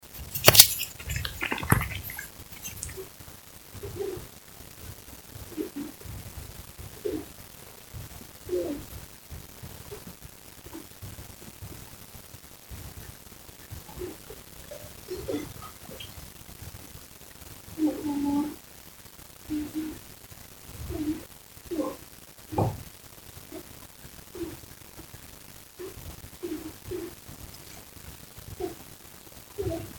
Soundscapes > Indoors
Little recording i made with my girl, good for cuckold games and themes. Simulating having sex up stairs, audio boosted. I missed a little in the heat of battle.